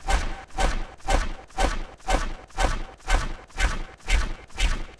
Sound effects > Electronic / Design
These samples were made by loading up samples from my FilthBot 125, Wood Chopping Techno and Broken Freezer packs into Soundmorph Evil Twin Reaktor ensemble. Expect muffy sci-noises and noisy 4/4 rhythms. This sample is a sharp hit with slight crunch underneath.
sci-fi, noise, techno, scifi, sound-design, industrial-techno, industrial, industrial-noise, royalty